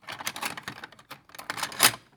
Sound effects > Objects / House appliances
knives handling2
cutlery, knives, silverware